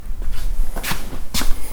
Sound effects > Other mechanisms, engines, machines
metal shop foley -030
bam, bang, boom, bop, crackle, foley, fx, knock, little, metal, oneshot, perc, percussion, pop, rustle, sfx, shop, sound, strike, thud, tink, tools, wood